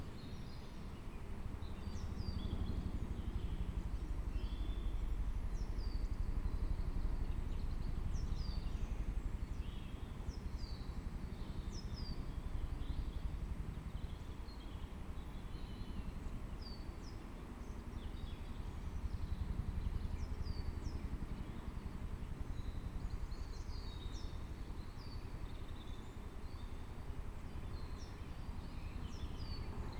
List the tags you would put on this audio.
Soundscapes > Nature
alice-holt-forest
data-to-sound
modified-soundscape
nature
phenological-recording
natural-soundscape
raspberry-pi
weather-data
field-recording
artistic-intervention
Dendrophone
soundscape
sound-installation

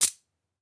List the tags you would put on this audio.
Sound effects > Other mechanisms, engines, machines
garage
clap
sample
hit

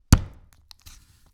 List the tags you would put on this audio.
Sound effects > Experimental
bones
foley
onion
punch
thud
vegetable

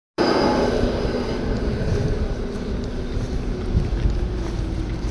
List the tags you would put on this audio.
Soundscapes > Urban
recording
Tampere
tram

